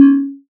Instrument samples > Synths / Electronic
CAN 2 Db
additive-synthesis, bass